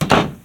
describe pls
Sound effects > Objects / House appliances
door sauna close1
Sauna door being closed. Recorded with my phone.
closing, door, sauna, shut, close, wooden